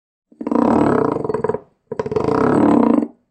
Sound effects > Objects / House appliances
Glass with ridges rolling on a wood panel
Idk, just sounded cool
glass, Mechanical